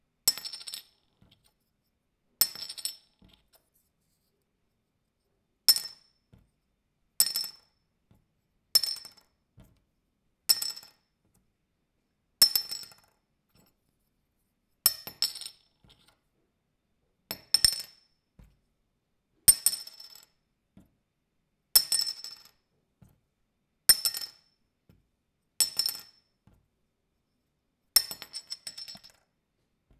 Sound effects > Objects / House appliances
Subject : A beer cam falling over doing the "Eulers disk" effect of just wobbling on the wooden table. Date YMD : 2025 04 06 Location : Saint Assiscle France. Hardware : Zoom H2N, in MS mode. Weather : Processing : Trimmed and Normalized in Audacity.